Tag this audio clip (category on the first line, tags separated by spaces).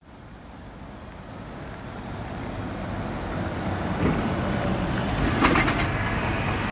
Soundscapes > Urban
bus transport vehicle